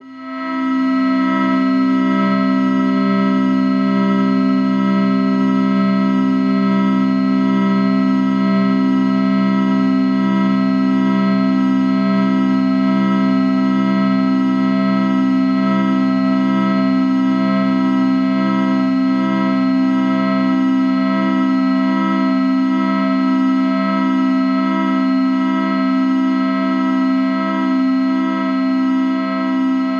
Soundscapes > Synthetic / Artificial
Ambient 1.5 C-G-F Chord
Synthed with the FL studio 'Pluck'' plugin only. I used Vocodex and set ''Car horn'' as the carrier. Processed with ZL EQ.